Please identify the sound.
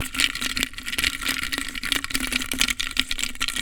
Sound effects > Objects / House appliances
Shaking the nespresso cups inside their box :)
coffee, cups, nespresso